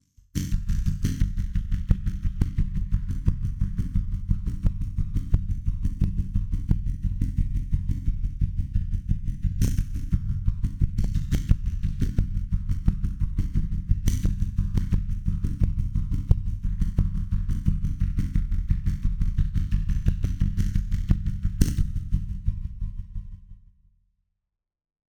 Music > Solo percussion
Simple Bass Drum and Snare Pattern with Weirdness Added 011
Bass-and-Snare, Bass-Drum, Experimental, Experimental-Production, Experiments-on-Drum-Beats, Experiments-on-Drum-Patterns, Four-Over-Four-Pattern, Fun, FX-Drum, FX-Drum-Pattern, FX-Drums, FX-Laden, FX-Laden-Simple-Drum-Pattern, Glitchy, Interesting-Results, Noisy, Silly, Simple-Drum-Pattern, Snare-Drum